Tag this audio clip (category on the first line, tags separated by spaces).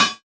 Instrument samples > Synths / Electronic
synthetic fm surge electronic